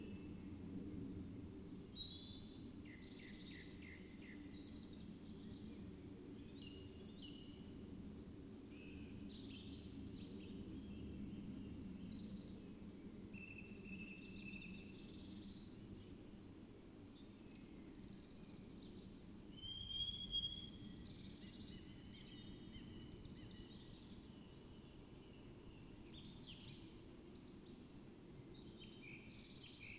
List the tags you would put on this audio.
Soundscapes > Nature
sound-installation
soundscape
natural-soundscape
nature
phenological-recording
alice-holt-forest
weather-data
field-recording
data-to-sound
modified-soundscape
artistic-intervention
Dendrophone
raspberry-pi

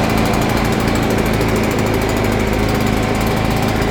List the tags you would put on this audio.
Sound effects > Other mechanisms, engines, machines
blower
idle
motor
generator
idling
engine

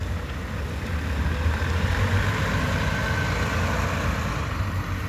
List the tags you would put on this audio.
Sound effects > Vehicles
transportation
bus
vehicle

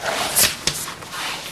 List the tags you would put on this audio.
Sound effects > Objects / House appliances
game
pick-up

